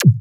Instrument samples > Percussion
Glitch-Liquid Kick 1
Sample used from FLstudio original sample pack. Plugin used: Vocodex.
Kick, Organic